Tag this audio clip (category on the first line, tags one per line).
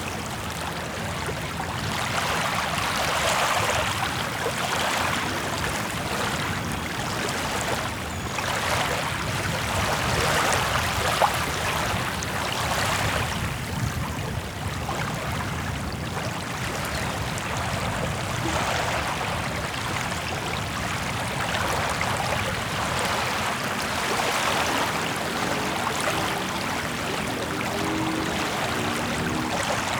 Soundscapes > Nature
ambiance
ambient
beach
coast
field-recording
nature
ocean
pier
sea
sea-shore
seaside
shore
soundscape
surf
water
wave
waves